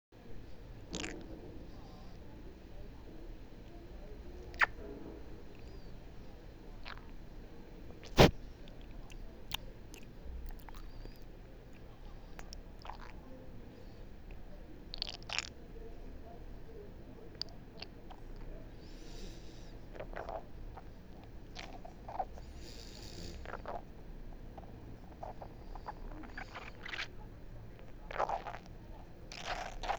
Sound effects > Natural elements and explosions
20250514 1511 wet sfx phone microphone
recording, atmophere, field